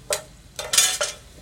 Sound effects > Objects / House appliances
Bỏ Xẻng Và Vá Vào Chảo - Put Stuff In Pan
Kỳ Duyên put stuff in pan. Record use iPad 2 Mini, 2025.08.16 10:22